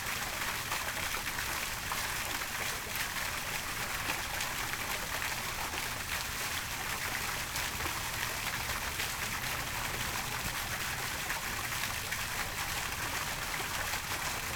Soundscapes > Urban
water fountain city 01
Artificial fountain of an apartment building.
artificial, fountain, water